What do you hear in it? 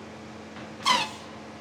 Sound effects > Vehicles
VEHCnst Street Construction Cement Mixer Truck Loud Whistle Usi Pro AB RambleRecordings 001
This is the sound of a loud whistle from a cement mixer while it was pouring cement for a street repair. This was recorded in downtown Kansas City, Missouri in early September around 13:00h. This was recorded on a Sony PCM A-10. My mics are a pair of Uši Pros, mounted on a stereo bar in an AB configuration on a small tripod. The mics were placed in an open window facing the street where some road construction was happening. The audio was lightly processed in Logic Pro, The weather was in the low 20s celsius, dry, and clear.
construction-site, vehicle, construction, hydraulics, cement-mixer, hiss